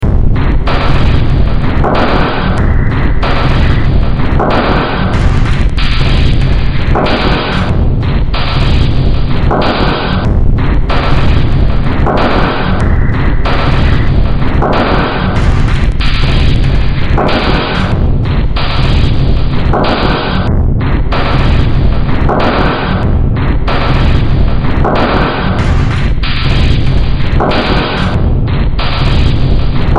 Music > Multiple instruments
Demo Track #3326 (Industraumatic)
Games, Underground, Noise, Cyberpunk, Horror, Sci-fi, Industrial, Ambient, Soundtrack